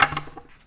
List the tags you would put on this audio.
Sound effects > Other mechanisms, engines, machines
bam bang boom bop crackle foley fx little metal oneshot perc percussion sfx sound strike thud tink tools wood

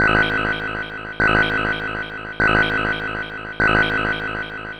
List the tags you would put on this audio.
Instrument samples > Percussion
Loop Packs Ambient Underground Weird

